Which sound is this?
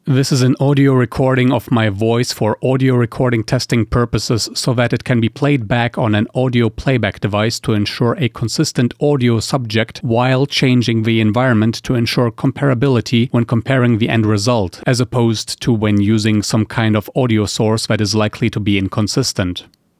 Speech > Solo speech
Voice for Audio Recording Test Comparison
Voice recording for testing recording setups. "This is an audio recording of my voice for audio recording testing purposes so that it can be played back on an audio playback device to ensure a consistent audio subject while changing the environment to ensure comparability when comparing the end result, as opposed to when using some kind of audio source that is likely to be inconsistent."
speaking, talking, vocal